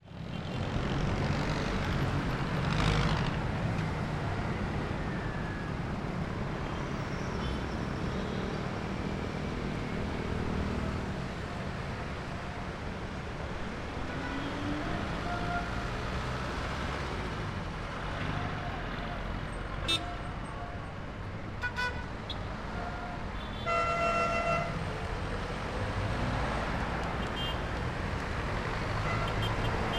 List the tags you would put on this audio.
Soundscapes > Urban
ambience atmosphere car cars city field-recording honking horn jeepney jeepneys Manila motorcycle motorcycles noisy Philippines police-siren road soundscape street town traffic train truck trucks urban vehicles

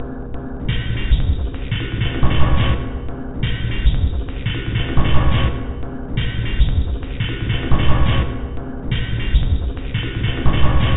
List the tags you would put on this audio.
Instrument samples > Percussion
Loopable Ambient Weird Drum Samples Loop Alien Dark Industrial Packs Soundtrack Underground

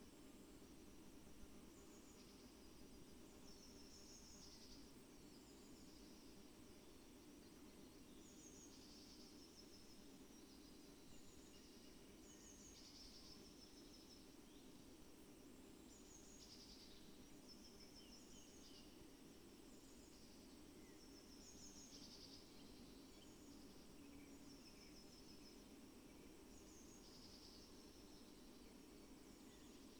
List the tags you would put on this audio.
Soundscapes > Nature
data-to-sound phenological-recording soundscape sound-installation raspberry-pi alice-holt-forest natural-soundscape Dendrophone modified-soundscape weather-data nature field-recording artistic-intervention

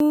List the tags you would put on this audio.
Sound effects > Electronic / Design
videogame animalcrossing

